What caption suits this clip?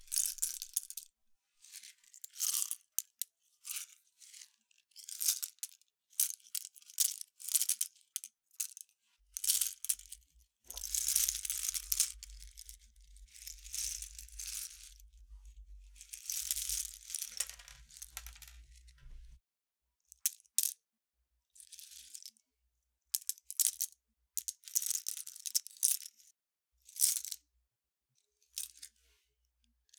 Sound effects > Natural elements and explosions
The sound of empty pistachio shells being moved from one hand to another. Could be edited into the sound of coins, dice, or any small object trading hands. Could also be used for the sound of someone digging through a pile. I used this to accentuate the sound of sand and rain falling in a video.